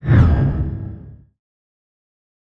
Sound effects > Other
Sound Design Elements Whoosh SFX 015
swoosh, sound